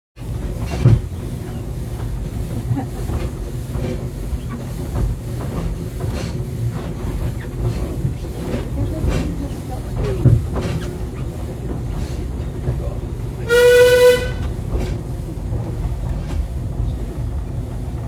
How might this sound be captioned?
Soundscapes > Other
Talyllyn Railway
Recording from the inside of a train carriage on the Talyllyn heritage railway. You can hear the clanking of the train and a whistle at the end.
clatter, locomotive, rail, whistle